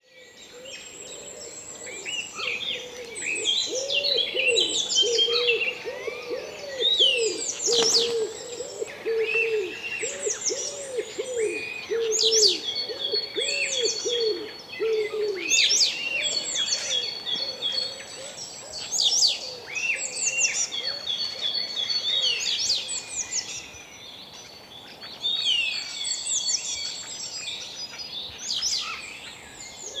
Soundscapes > Nature
Forest atmosphere 008(localization Poland)
ambience, ambient, atmosphere, background, birds, birdsong, calm, environmental, European-forest, field-recording, forest, natural, nature, outdoor, peaceful, Poland, rural, soundscape, wild